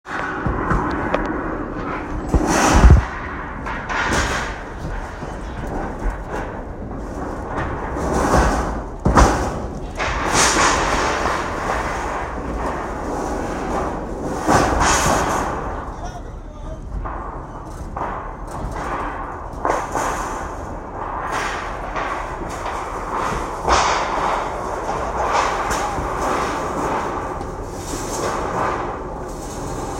Sound effects > Objects / House appliances
Đưa Miến Tôn Lên Nắp Nhà - Thin Metal On House Roof
Sound from move long metal tôn put on house roof. Record use iPhone 7 Plus smart phone. 2025.12.30 16:53